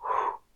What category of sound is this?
Sound effects > Human sounds and actions